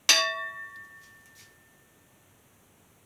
Sound effects > Other
Hitting a metal pipe. Recorded with my phone.